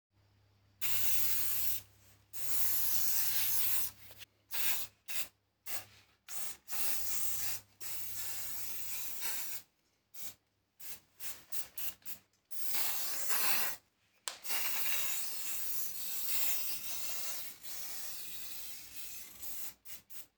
Sound effects > Objects / House appliances
insect repellent spraying
Various sprays of insect repellent from nearly exhaust can at a distance od 10-15 centimeters. The spray is close to the skin and can be used to mimic hairspray, spray paint o similar pressurized or compressed cans.
hair, spraying, air, aerosol, pressure, repellent, spray, hairspray, insect, appliances, paint, can, deodorant, spraycan